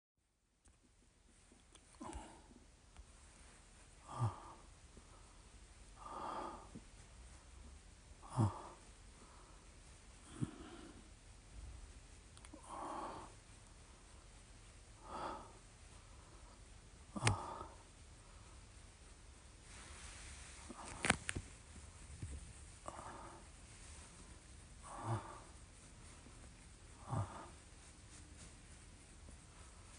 Speech > Other
Man masturbating at night
Man masturbates at night till orgasm.
groan, Orgasm, pleasure